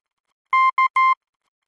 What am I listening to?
Sound effects > Electronic / Design
A series of beeps that denote the letter K in Morse code. Created using computerized beeps, a short and long one, in Adobe Audition for the purposes of free use.